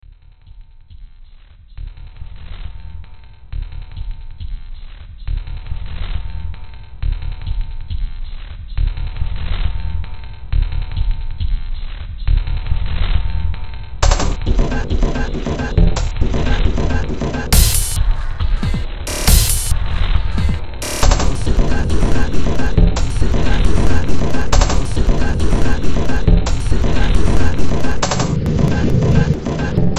Music > Multiple instruments
Demo Track #3713 (Industraumatic)
Sci-fi, Industrial, Horror, Underground, Cyberpunk, Noise, Ambient, Soundtrack, Games